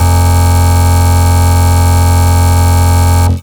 Instrument samples > Synths / Electronic
Dubstep Sub Bass 2 F
Synthed with phaseplant only. Processed with Vocodex.
Bass, Dubstep, Sub, Subbass